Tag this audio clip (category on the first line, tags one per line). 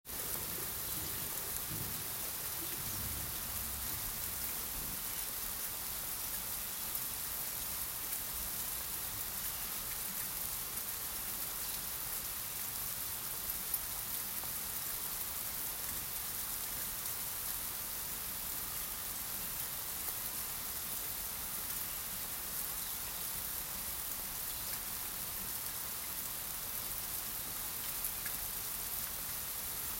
Soundscapes > Nature
field-recording
nature
rain
storm
thunder
thunderstorm
weather
wind